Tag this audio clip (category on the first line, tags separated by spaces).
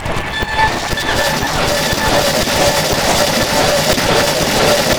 Sound effects > Electronic / Design
industrial-noise techno sci-fi sound-design rhythm industrial scifi commons industrial-techno noise